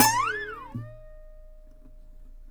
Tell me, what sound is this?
Music > Solo instrument
acoustic guitar slide10
acosutic, chord, chords, dissonant, guitar, instrument, knock, pretty, riff, slap, solo, string, strings, twang